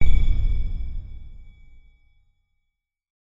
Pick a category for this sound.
Sound effects > Electronic / Design